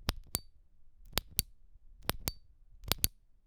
Sound effects > Objects / House appliances
Olight I3T2 Button click
Subject : Clicking the button of a Olight I3T mk2 (single AAA battery pen light) Date YMD : 2025 06 08 Location : Albi 81000 Tarn Occitanie France. Indoors Hardware : Tascam FR-AV2, Rode NT5. Weather : Night time Processing : Trimmed in Audacity.
I3T2, click, FR-AV2, Mono, 2025, Single-mic-mono, pen-light, Olight, NT5, switch, pocket-light, Button, Flash-light, clicking, Rode, light, Tascam